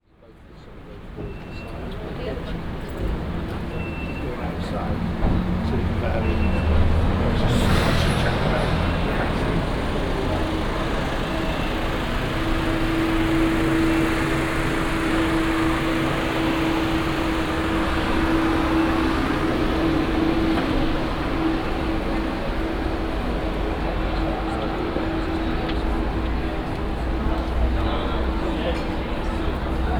Soundscapes > Urban
Cardiff - Quay St
fieldrecording, cardiff, citycentre, city